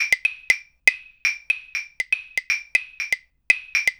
Music > Solo percussion
Three Claves-5
eight loops made from samples of three claves in interesting polyrhythms. Can be used alone or in any combination (they all should sound fine looped together in virtually any order)